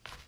Sound effects > Human sounds and actions
Footstep Leaves 2
A single footstep on a hard of a soft crunchy surface, could be carpet or leaves.
footstep, leaves, walking, walk, foley, foot, common, feet, step, shoe, nature